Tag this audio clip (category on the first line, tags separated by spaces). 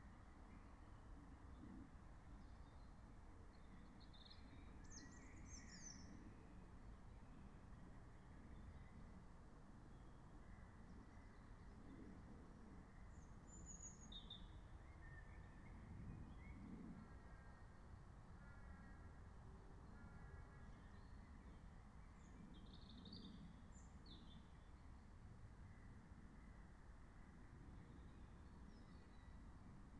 Soundscapes > Nature
alice-holt-forest soundscape field-recording meadow phenological-recording nature raspberry-pi natural-soundscape